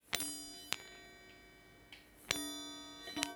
Instrument samples > Other
Baoding Balls - Low - 01 (Short)
balls, closerecording